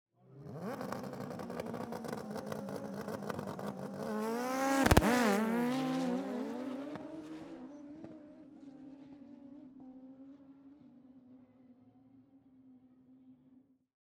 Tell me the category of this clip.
Soundscapes > Other